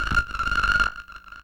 Sound effects > Experimental
Analog Bass, Sweeps, and FX-192
retro, sci-fi, alien, scifi, complex, dark, korg, sweep, basses, synth, sample, electronic, vintage, oneshot, fx, robotic, electro, analog, sfx, analogue, machine, pad, weird, mechanical, snythesizer, trippy, effect, robot, bassy, bass